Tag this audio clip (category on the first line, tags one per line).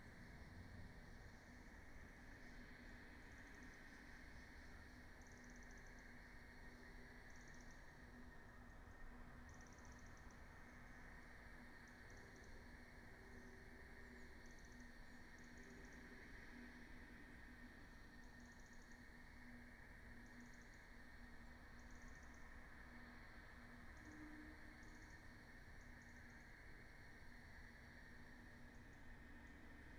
Soundscapes > Nature
alice-holt-forest
data-to-sound
Dendrophone
field-recording
natural-soundscape
nature
phenological-recording
raspberry-pi
sound-installation
soundscape
weather-data